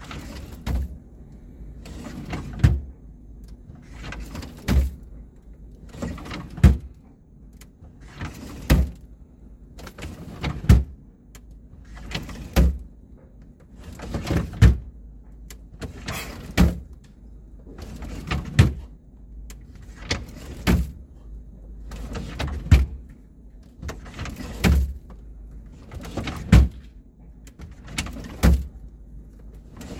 Sound effects > Objects / House appliances
Refrigerator drawers sliding open and closed.